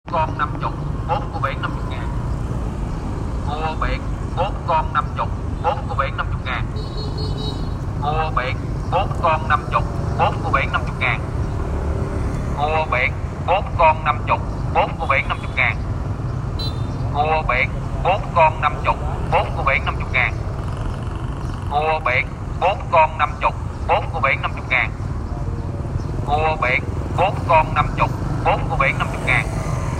Speech > Solo speech
Của Biển Bốn Con 50, Bốn Của Biển 50 Ngàn
Man sell crab. Record use iPhone 7 Plus 2024.12.23 17:08